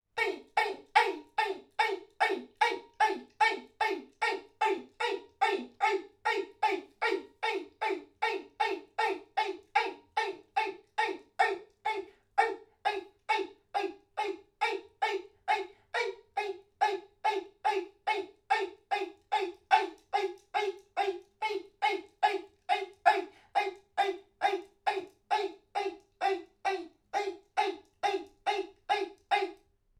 Sound effects > Human sounds and actions
Alien - Cheer 10 Ay
Alien / Weird / Other worldly or fake-culture like applauses. I should have stuck to one kind of either bops or chicks or "ayayayay" rather than making multiple different kinds, the result would have been more convincing. Also only 13 different takes is a little cheesy. I find those applause type things need around 20-30. A series of me recording multiple takes in a medium sized bedroom to fake a crowd. Clapping/talking and more original applause types, at different positions in the room. Recorded with a Rode NT5 XY pair (next to the wall) and a Tascam FR-AV2. Kind of cringe by itself and unprocessed. But with multiple takes mixed it can fake a crowd. You will find most of the takes in the pack.
original, solo-crowd, person, experimental, individual, NT5, XY, weird, applause, single, Alien, alternate, Tascam, indoor, Rode, FR-AV2